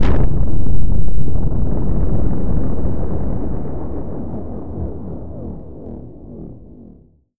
Sound effects > Electronic / Design
A star collapses at the zenith of the planets' gravitational alignment, tearing a portal to another dimension, another dimension... Created by layering multiple piano notes and drums at the same time in FL Studio and passing the output through Quadrant VST. This was made in a batch of 14, many of which were cleaned up (click removal, fading, levelling, normalization) where necessary in RX and Audacity:
absorption, anomaly, bend, black-hole, collapse, compression, crush, displacement, distortion, flux, gravity, implodes, imploding, implosion, inertia, recoil, resonance, rift, ripple, rupture, singularity, space, surge, tear, teleportation, tremor, twist, vacuum, void, warp